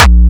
Instrument samples > Percussion
Classic Crispy Kick 1-A#
It's very simple to synthed this sample, you just need to layer my punch sample of #G, and use overdrive FX to distory a sine wave bass, then layer them both. Final-Processed with: Waveshaper, ZL EQ.
brazilianfunk, crispy, distorted, Kick, powerful, powerkick